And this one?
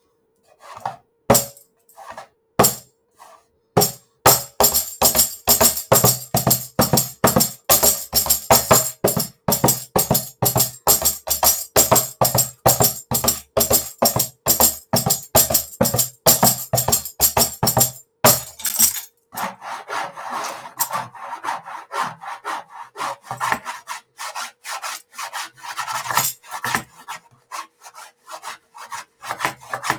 Instrument samples > Percussion
Street Parade Rock-tambourie beats
Alex plays the tambourine. Use for your music pieces, songs, compositions, musicals, operas, games, apps
rhythm, beat, tambourine, groovy, percussion